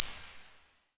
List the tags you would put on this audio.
Instrument samples > Synths / Electronic
Analog IR Reverb

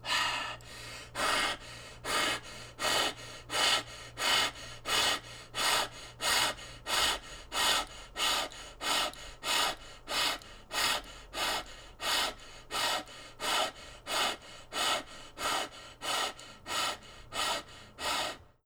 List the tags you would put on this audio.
Sound effects > Human sounds and actions
Blue-brand Blue-Snowball breath human pant slow

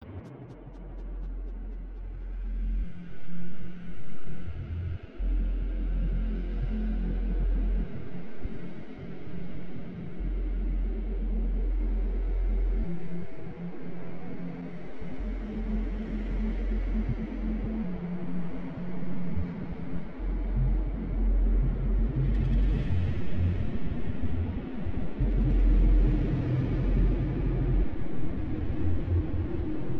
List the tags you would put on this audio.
Soundscapes > Synthetic / Artificial
evolving effect landscape ambience rumble texture low alien atmosphere experimental bassy bass drone long roar shimmer glitch shifting shimmering dark glitchy wind sfx synthetic fx slow ambient howl

